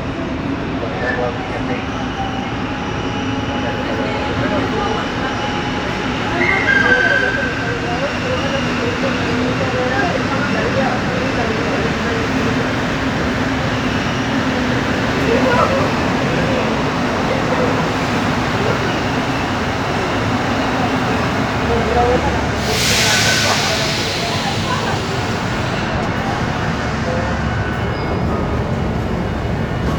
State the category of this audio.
Sound effects > Vehicles